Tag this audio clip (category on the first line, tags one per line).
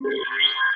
Sound effects > Electronic / Design
alert
confirmation
digital
interface
message
selection